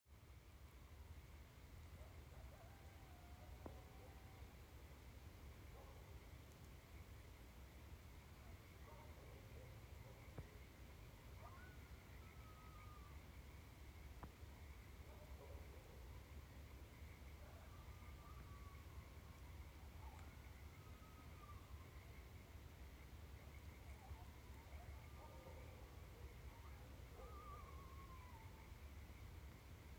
Soundscapes > Nature
Frogs, coyotes, dogs full moon 02/15/2022
Frogs and dogs and coyotes- perfect full moon night
dogs, field-recording, coyotes-full-moon